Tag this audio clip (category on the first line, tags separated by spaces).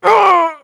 Sound effects > Human sounds and actions
Human Hurt Scream